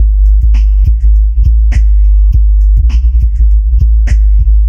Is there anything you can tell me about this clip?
Instrument samples > Percussion
102bpm basspump
102BPM bass club breakbeat deep sound hard genre for your creative productions